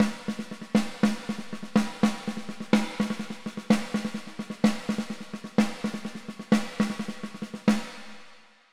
Music > Solo percussion
snare Processed - march beat - 14 by 6.5 inch Brass Ludwig
beat, hit, drumkit, rim, snareroll, rimshots, crack, perc, snare, snares, brass, sfx, percussion, realdrum, roll, realdrums, ludwig, flam, reverb, acoustic, hits, snaredrum, fx, rimshot, drums, drum, oneshot, processed, kit